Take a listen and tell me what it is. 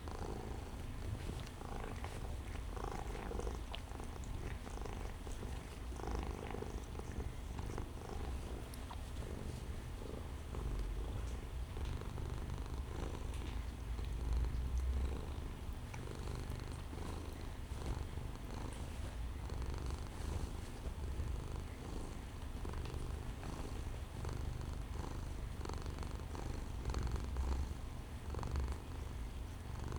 Sound effects > Animals
Subject : Pearl and Perran cats, mother and daughter, having their typical argument after snuggling. Probably Pearl cleaning Perran a bit too aggressively and Perran's retaliation escalating the situation until Pearl chases her... Date YMD : 2025 November 26 around 11h30 Location : Albi 81000 Tarn Occitanie France. Hardware : Dji Mic 3 as mic and recorder. Weather : Processing : Trimmed and normalised in Audacity. L= mic close to the cats. It gets bumped to there's a clip which I copy/pasted an attinuated unclipped version after. R = Mic on a drying rack 1.5m away ish. Notes : I was in the room, my desk is 1.5m away, so human noises may apply.